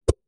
Sound effects > Objects / House appliances
Stickman whoosh movement (hard version)
For animation that have fast movement (object that used for producing this sound: hanger)
movement, woosh